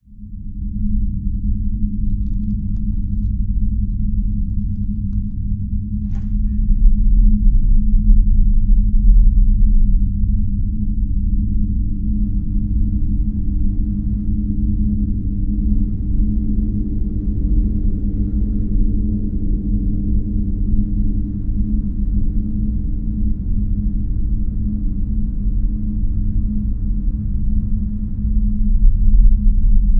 Soundscapes > Other
Dark and unsettling basement ambience recorded and designed for horror or thriller settings. Includes distant mechanical hums, subtle dripping water, low-frequency drones, and occasional metallic rattles. Suitable for games, films, or ambient layers in haunted environments.